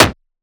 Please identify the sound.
Sound effects > Vehicles
Car Close the door(White Noise Synthed)
Only synthed with whithnoise My synthsiser only used 3Xosc Do somany Softclip,and Dynamic EQ with it And Used Transient Shaper Of KHS
closes, door, Car